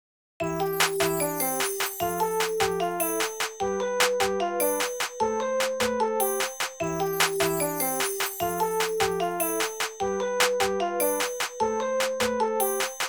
Music > Multiple instruments
Main menu
Background sound for a mobile game